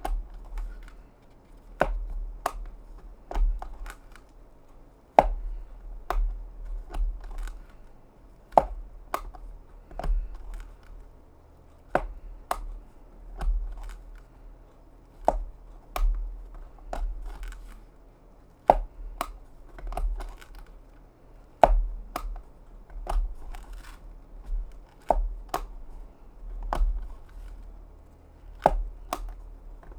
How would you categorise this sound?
Sound effects > Objects / House appliances